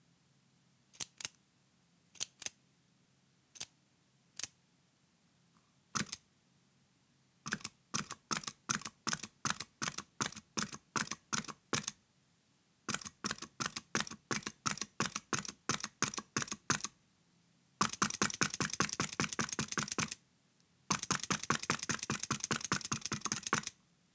Sound effects > Other mechanisms, engines, machines
Garvey Stamper.1970s
Genuine Garvey ink price stamper from the 1970's. Used extensively in grocery stores until UPC scanning took over. First sounds are the stamper being compressed and released, then the iconic sound of a stamper on food cans. AT 2035 into an SD Mixpre6.
1960s, 1970s, garvey, grocery, ink, ink-price-stamper, price, stamper